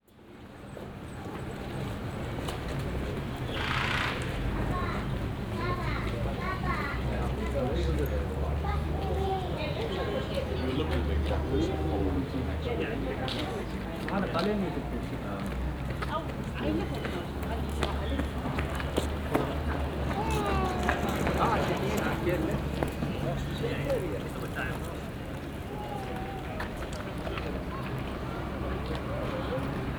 Soundscapes > Urban
Cardiff - Into The Castle Grounds
fieldrecording, City, urban, Cardiff, Citycentre